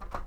Sound effects > Objects / House appliances
A USB drive being inserted into a PC.